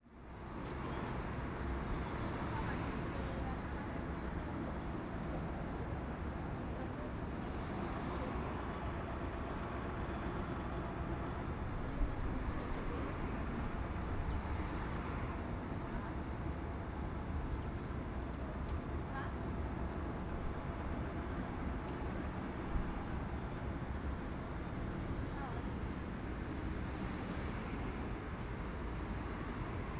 Sound effects > Other
AMBPubl Cinematis FieldRecording TunnelAmbience Distant
A moderate city ambience near a tunnel with traffic layers and distant tunnel resonance. Recorded with a Zoom H1. --- The big Year-End Holiday discount is here. Get my entire discography at 90% off. Enjoy — and have fun!
ambience, bike, car, city, distant, environmental, field-recording, layers, people, resonance, street, traffic, tunnel, urban